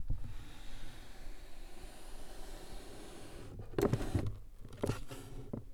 Sound effects > Objects / House appliances
Wooden Drawer 13
drawer, open, wooden